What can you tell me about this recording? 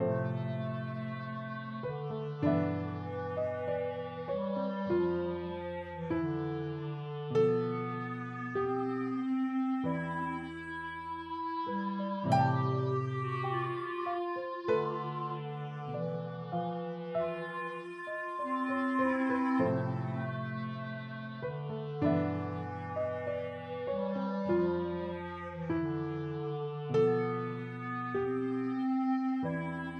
Music > Multiple instruments
Final Phantomsee 98bpm key chord melody progression loop
a soft chill ambient pad and piano key loop i made that is reminiscent of final fantasy
music, fantasy, synthloop, soft, loop, ambient, pads, soundtrack, game, melodyloop, synth, chill, videogame, pad, pianoloop, adventure, melody, reverb, roleplay, loopable, keys, key, piano, washed